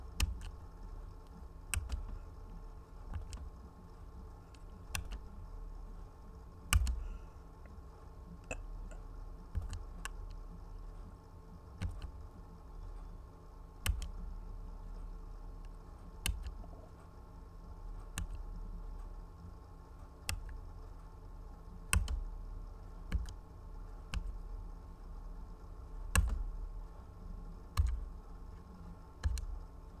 Sound effects > Objects / House appliances
GAMEVideo-Blue Snowball Microphone, MCU Nintendo, DS, Console, Buttons Nicholas Judy TDC
Nintendo DS console buttons.
Blue-brand, console, foley, nintendo-ds